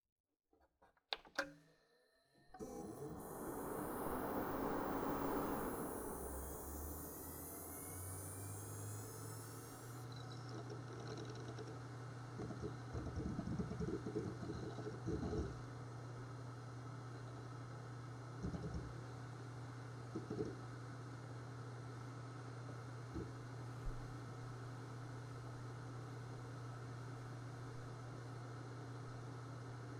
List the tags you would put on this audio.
Sound effects > Electronic / Design
beep
computer
digital
disk
hard-drive

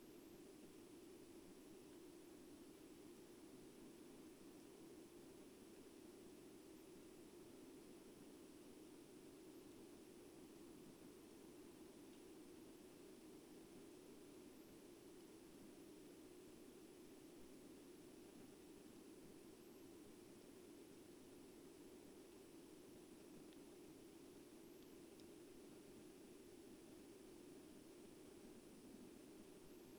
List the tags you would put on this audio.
Soundscapes > Nature
raspberry-pi
natural-soundscape
modified-soundscape
weather-data
alice-holt-forest
phenological-recording
Dendrophone
artistic-intervention
soundscape
nature
sound-installation
data-to-sound
field-recording

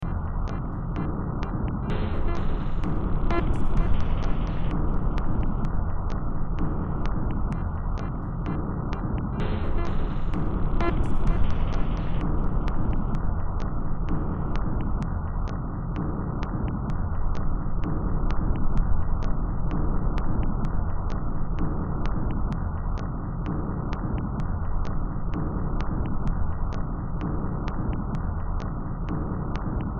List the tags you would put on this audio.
Music > Multiple instruments
Games
Underground
Industrial
Sci-fi
Soundtrack
Cyberpunk
Noise
Ambient
Horror

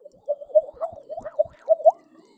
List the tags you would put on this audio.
Sound effects > Objects / House appliances
fx
clunk
mechanical
sfx
bonk
object
stab
foundobject
metal
industrial
glass
natural
hit
perc
percussion
oneshot
foley
drill
fieldrecording

